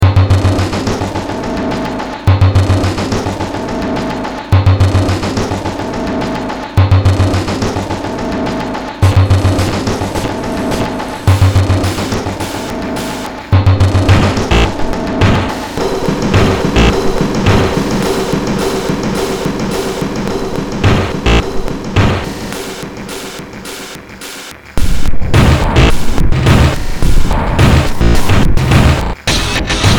Music > Multiple instruments
Demo Track #3805 (Industraumatic)
Ambient, Cyberpunk, Games, Horror, Industrial, Noise, Sci-fi, Soundtrack, Underground